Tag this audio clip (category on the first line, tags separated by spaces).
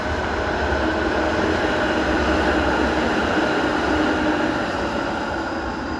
Soundscapes > Urban
Tram Drive-by field-recording